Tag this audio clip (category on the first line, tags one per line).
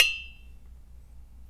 Sound effects > Objects / House appliances
bonk
clunk
drill
fieldrecording
foley
foundobject
fx
glass
hit
industrial
mechanical
metal
natural
object
oneshot
perc
percussion
sfx
stab